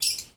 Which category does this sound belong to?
Sound effects > Animals